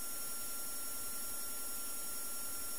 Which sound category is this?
Soundscapes > Synthetic / Artificial